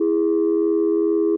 Instrument samples > Synths / Electronic
Landline Phonelike Synth E5
Holding-Tone, JI, JI-3rd, JI-Third, just-minor-3rd, just-minor-third, Landline, Landline-Holding-Tone, Landline-Phone, Landline-Phonelike-Synth, Landline-Telephone, Landline-Telephone-like-Sound, Old-School-Telephone, Synth, Tone-Plus-386c